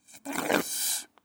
Sound effects > Human sounds and actions
Jelly Sucked Up a Metal Straw (Short)
Cola-flavoured jelly sucked up a metal straw, making a bizarre sound. Short version.
jello, jelly, metal, pd, pudding, slime, slurp, straw, sucked